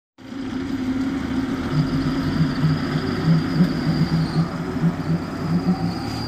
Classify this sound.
Sound effects > Vehicles